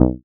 Instrument samples > Synths / Electronic
MEOWBASS 4 Eb
additive-synthesis, bass, fm-synthesis